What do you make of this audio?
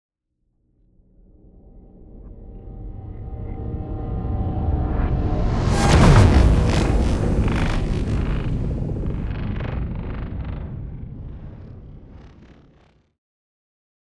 Sound effects > Other
Riser Hit sfx 120
Riser Hit powerful ,cinematic sound design elements, perfect for trailers, transitions, and dramatic moments. Effects recorded from the field.
bass, boom, cinematic, deep, epic, explosion, game, hit, impact, implosion, industrial, movement, riser, stinger, sub, sweep, tension, thud, trailer, transition, whoosh